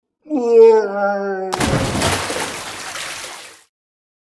Sound effects > Other
Troll Splashing into the water
Nooooo then splash for classic Billy Goats Gruff Nursery Rhyme - Recorded on Blue Snowball Mic. Edited in Garage Band
fall, water, splash